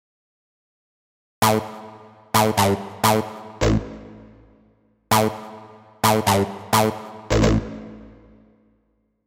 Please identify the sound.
Instrument samples > Synths / Electronic
Ableton Live.Simple VST.Fury-800......Synth 130 bpm Free Music Slap House Dance EDM Loop Electro Clap Drums Kick Drum Snare Bass Dance Club Psytrance Drumroll Trance Sample .